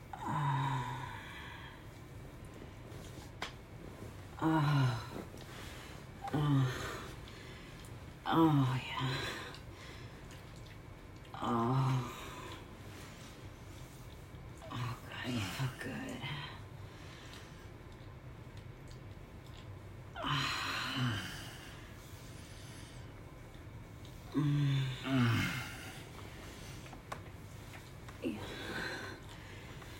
Sound effects > Human sounds and actions
The Push
The room was hushed, save for the faint creak of the bed and the rhythm of their breaths. His skin was warm against hers, the weight of his presence grounding, familiar, and electrifying all at once. As he entered her, slowly, deliberately, the world seemed to narrow to that single point of contact. She moaned deeply—satisfaction at last—her fingers tightening on his back. Soft moans slip past her lips, not loud, but layered with pleasure and the ache of deep connection. The air held the scent of skin and warmth, and the sound that followed was part whisper, part surrender—intimate in a way words could never quite capture.
couple, moan, sex, wet